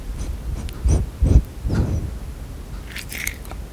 Sound effects > Animals
Cat sniffing mic
Subject : A elderly female Cat called "minette" sniffing the microphone. Date YMD : 2025 04 22 Location : Gergueil France Hardware : Tascam FR-AV2 Rode NT5 handheld. Weather : Processing : Trimmed and Normalized in Audacity. a HPF might have been applied.